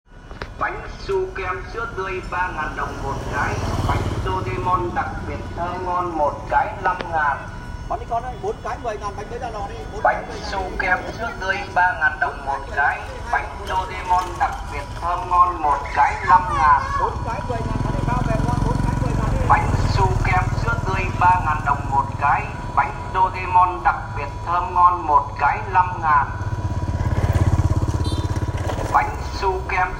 Speech > Solo speech
Bánh Su Kem Sữa Tươi 3000 Đồng Một Cái
Man sell food say 'Bánh su kem sữa tươi 3000 đồng nột cái. Bành Đôrêmon đặc biệt thơm ngon, một cái 5000’. Record use iPhone 7 Plus smart phone 2025.09.23 10:15
voice, food, male, sell, viet, business, man